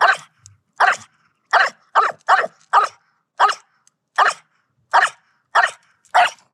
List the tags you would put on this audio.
Sound effects > Animals
yappy yapping puppy bark

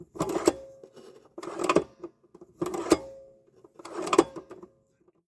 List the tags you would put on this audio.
Sound effects > Other mechanisms, engines, machines
foley out Phone-recording slide slide-projector

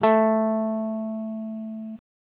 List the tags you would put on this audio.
Instrument samples > String
electric electricguitar guitar stratocaster

